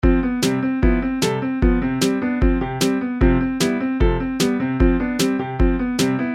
Music > Other
Time To Travel Looped
Looped Made In chrome music lab
Musicbeach, Traveling